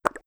Soundscapes > Nature
Frog Select
Button, Click, Frog, Select, UI